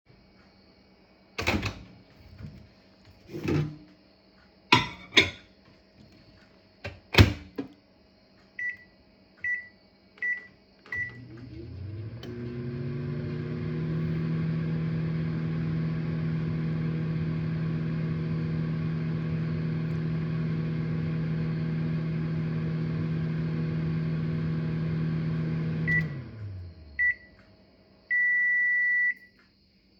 Sound effects > Objects / House appliances
It is a microwave. Recorded on my iPhone 15 Pro and edited in Logic Pro X.
beep, click, electronic, field-recording